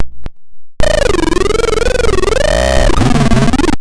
Sound effects > Electronic / Design
Optical Theremin 6 Osc dry-053
Bass, DIY, noisey, Electro, Optical, Trippy, Scifi, Synth, Robotic, Infiltrator, Instrument, Sci-fi, Handmadeelectronic, Glitchy, SFX, Experimental, Dub, Analog, Sweep, Theremins, FX, Theremin, Robot, Alien, Electronic, Digital, Otherworldly, Spacey, Noise, Glitch